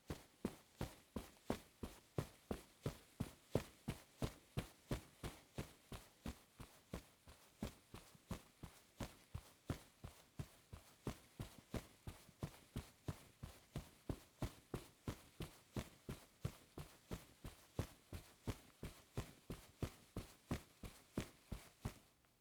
Sound effects > Human sounds and actions
footsteps carpet, run
running, carpet, footsteps, foley